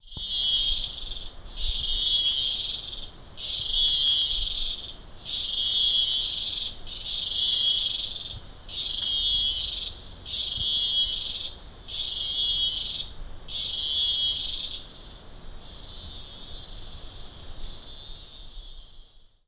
Soundscapes > Nature
Cicadas chirping in the jungle
Cicadas are chirping in the jungle by the Rio Arenal in Costa Rica. Recorded with an Olympus LS-14.
chirp, cicadas, crickets, field-recording, insects, jungle